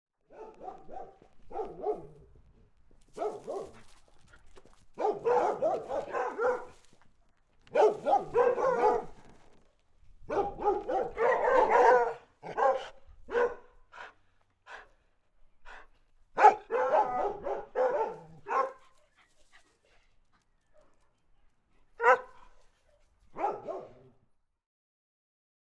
Sound effects > Animals
ANMLDog 2 dogs barking
2 dogs barking in a suburban backyard. Recorded with Zoom H6e and processed in iZotope
bark, dog, barking